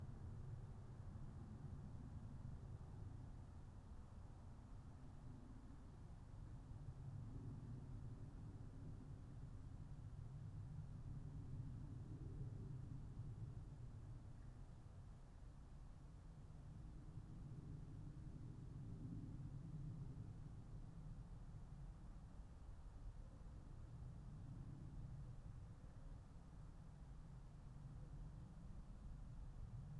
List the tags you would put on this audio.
Soundscapes > Nature
alice-holt-forest
artistic-intervention
field-recording
modified-soundscape
natural-soundscape
phenological-recording
raspberry-pi
soundscape
weather-data

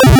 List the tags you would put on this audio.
Sound effects > Experimental
synthesizer
wave
ay-3-8910
squarewave
synthesis
synth
digital
sound-chip
retro
emulation
ay38910
square
square-wave
computer-chip

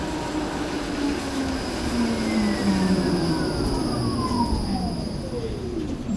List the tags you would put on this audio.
Sound effects > Vehicles
break
stop
tram

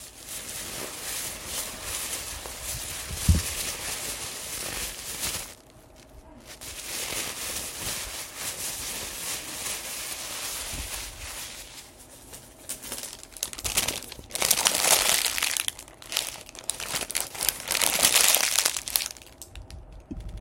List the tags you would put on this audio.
Sound effects > Objects / House appliances
destroy
garbage

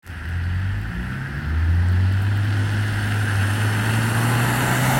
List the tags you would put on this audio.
Sound effects > Vehicles
field-recording
auto
street
traffic
car

